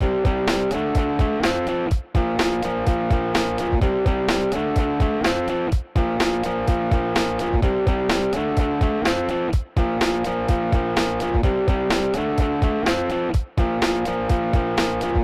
Music > Multiple instruments
Otherwise, it is well usable up to 4/4 126 bpm.